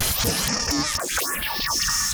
Sound effects > Experimental
Gritch Glitch snippets FX PERKZ-018
impact, hiphop, abstract, perc, zap, lazer, otherworldy, clap, whizz, sfx, fx, crack, experimental, laser, percussion, pop, idm, edm, glitch, alien, glitchy, impacts, snap